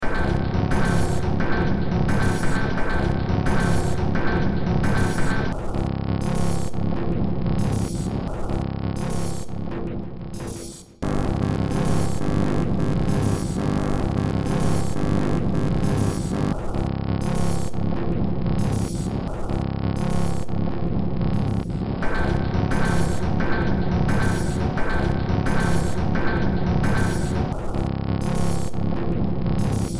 Music > Multiple instruments
Short Track #3749 (Industraumatic)
Ambient, Cyberpunk, Games, Horror, Industrial, Noise, Sci-fi, Soundtrack, Underground